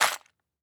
Sound effects > Other mechanisms, engines, machines
Short Shake 04
noise, shake